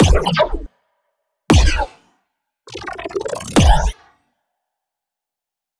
Sound effects > Electronic / Design
Laser/Magic Spell Impacts
Impacts that might fit heavy science fiction weaponry or magic spells. Made with Serum 2, Ableton Granulator III, and some processed foley hits I recorded.